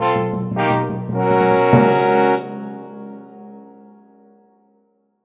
Instrument samples > Wind
French horn and Timpani Fanfare short
French horn and timpani fanfare made in Logic Pro X.
fanfare, frenchhorn, horn, logic, timpani